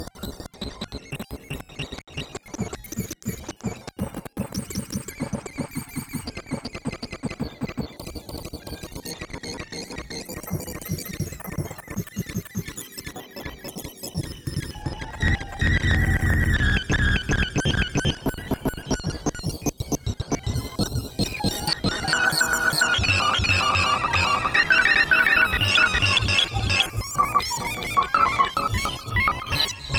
Soundscapes > Synthetic / Artificial
RGS-Random Glitch Sound 13
Sample used from ''Phaseplant Factory Samples'' Used multiple Fracture to modulate it. Processed with Khs Phaser, ZL EQ, Fruity Limiter, Vocodex.